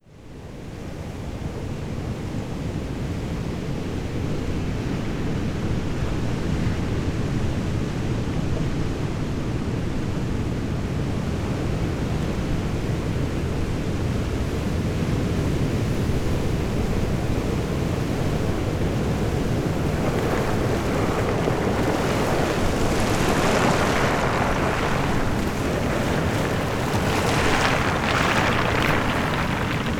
Soundscapes > Nature
waves move pebbles - short - montana de oro - 01.02.24
A wave breaks on the shore, giving a voice to stones. Montaña de Oro, California Recorded with Zoom H6 xy or ms mic I don't remember which